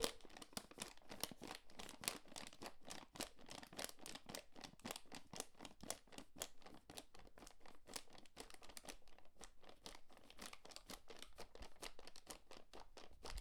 Sound effects > Experimental
100525 2240 ermesinde sacodeplastico tascamdr-40x 001
Sunday 11th May Around 10:40 pm In Ermesinde Plastic Bag (fx-h) Tasca dr-40x recorder Recorded indoors, close to the sound source 100525_2240_ermesinde_plasticbag_tascamdr-40x_01
experimental, field-recording